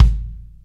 Instrument samples > Percussion
A good jazzkick. Just shy of perfection. Tama Silverstar Mirage 22"×16" 2010s acryl bassdrum/kick Please remind me to improve it.